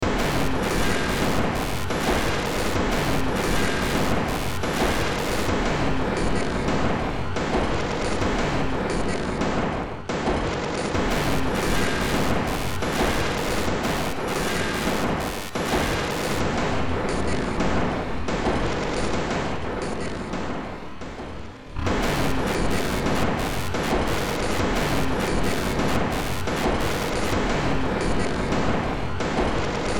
Music > Multiple instruments
Short Track #3624 (Industraumatic)
Ambient, Games, Horror, Noise